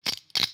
Sound effects > Other
lock break
51 - When a lock weakness is hit Foleyed with a H6 Zoom Recorder, edited in ProTools
lock, break, clicking